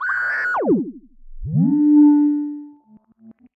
Sound effects > Experimental
Analog Bass, Sweeps, and FX-133
oneshot, sci-fi, analogue, machine, basses, alien, weird, robotic, synth, electronic, dark, scifi, bass, retro, sample, trippy, complex, korg, sweep, snythesizer, vintage, effect, sfx, analog, fx, bassy, mechanical, robot, pad, electro